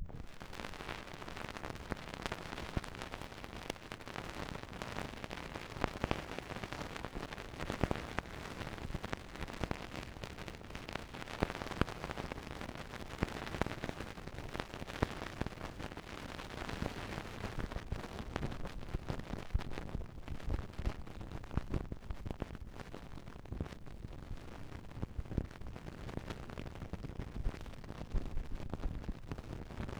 Sound effects > Objects / House appliances
stylus rotating on ungrooved vinyl record 2
ungrooved vinyl record crackle transferred with an Audio-Technica LP120 turntable